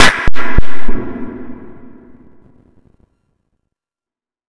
Sound effects > Other
just look at the waveform... No way, that happened...